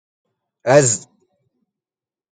Sound effects > Other
za-sisme
In Tajwid and Arabic phonetics, the place where a letter is pronounced is called "makhraj" (مَخْرَج), which refers to the specific point in the mouth or throat where a sound originates. The correct identification of the makhraj is crucial to ensure accurate pronunciation of Arabic letters, especially in the recitation of the Qur'an. This is my own voice. I want to put it as my audio html project.